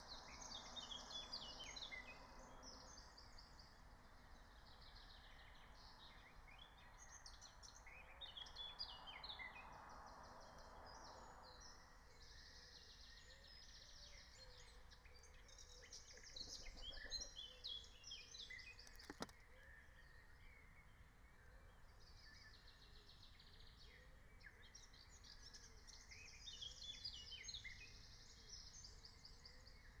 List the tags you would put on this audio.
Soundscapes > Nature
alice-holt-forest
field-recording
meadow
natural-soundscape
nature
raspberry-pi
soundscape

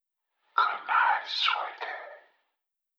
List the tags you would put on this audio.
Speech > Processed / Synthetic
dark
distorted
halloween
horror
noise

